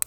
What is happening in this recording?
Sound effects > Objects / House appliances
OBJWrite-Blue Snowball Microphone, CU Pencil, Snap Nicholas Judy TDC
A pencil snap.